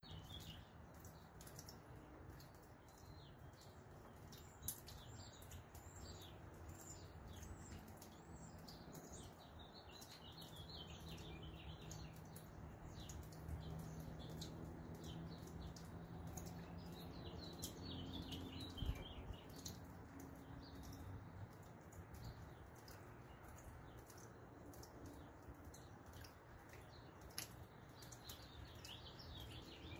Sound effects > Natural elements and explosions
A suburban atmosphere after the rain with CU of drips from roof to dirt.